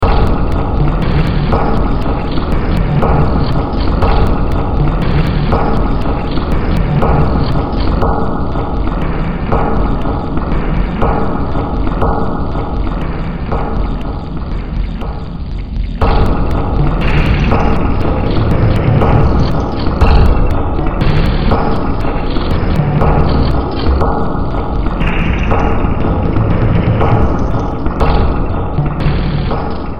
Music > Multiple instruments
Demo Track #2998 (Industraumatic)
Cyberpunk, Games, Horror, Soundtrack, Underground